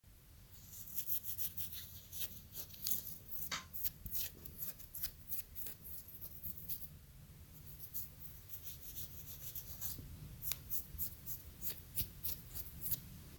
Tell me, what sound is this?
Sound effects > Human sounds and actions
I recorded myself itching my neck with my nails - Quality: bit of clothing rustling.
fingernail, fingernails, itch, itching, nails, neck, scratch, scratching, skin, touch